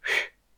Sound effects > Human sounds and actions
A simple blowing sound made by my mouth, I use this for my game dev for stealth games that involve blowing out candles to remove lights.